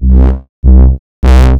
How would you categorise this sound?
Instrument samples > Synths / Electronic